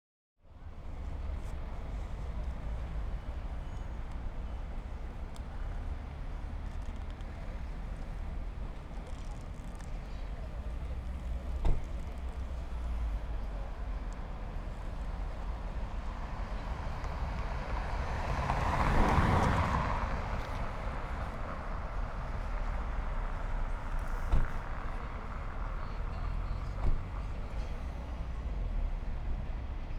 Soundscapes > Urban
Warsaw-East International Railway Station - North side - Main city bus terminal.
Tascam DR680 Mk2 and two Audio-Technica U851
Traffic, Transport, Bus, Street